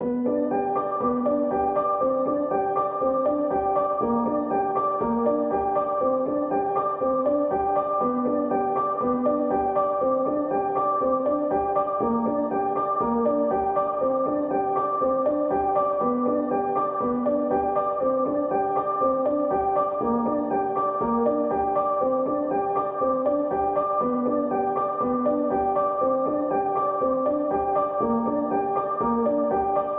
Music > Solo instrument
Piano loops 113 efect 4 octave long loop 120 bpm
120, loop, free, simplesamples, 120bpm, piano, simple, pianomusic, music, reverb, samples